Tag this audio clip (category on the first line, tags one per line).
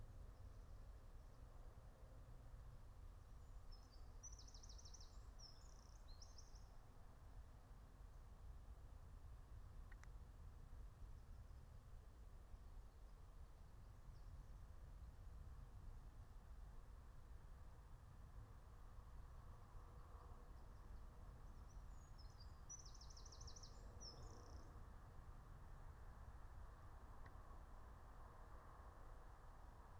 Soundscapes > Nature
phenological-recording
meadow
field-recording
alice-holt-forest
nature
raspberry-pi
soundscape
natural-soundscape